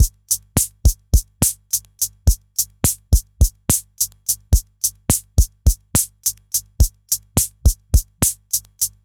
Instrument samples > Percussion
106 Welson Loop 01
DrumMachine, Loop, DrumLoop, Hi-Hats, Retro, Drums, Vintage, Rare, Synth, 106bpm, Electronic, Electro